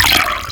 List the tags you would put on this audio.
Instrument samples > Percussion
alienware aqua crash current dew drizzle droplet drum drums flow fluid glass H2O liquid moisture pee peedrum percussion percussive pouring rain splash stream tide UFO water wave wet

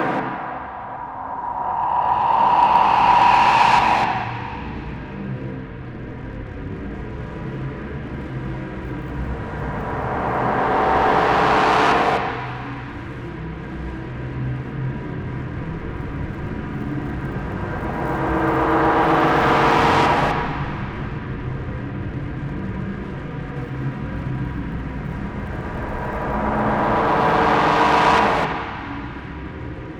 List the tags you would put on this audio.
Soundscapes > Synthetic / Artificial
alien ambience ambient atmosphere bass bassy dark drone effect evolving experimental fx glitch glitchy howl landscape long low roar rumble sfx shifting shimmer shimmering slow synthetic texture wind

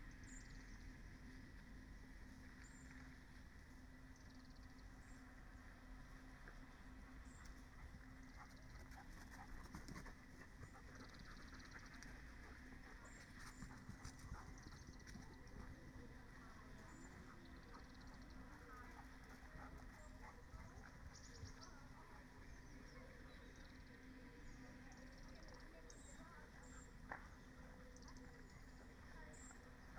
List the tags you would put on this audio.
Soundscapes > Nature
data-to-sound Dendrophone nature raspberry-pi soundscape

